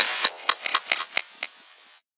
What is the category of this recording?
Sound effects > Electronic / Design